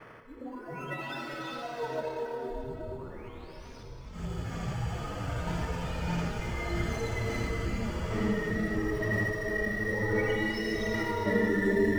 Sound effects > Electronic / Design
Murky Drowning 17
cinematic, content-creator, dark-design, dark-soundscapes, drowning, horror, mystery, noise, noise-ambient, PPG-Wave, science-fiction, sci-fi, scifi, sound-design, vst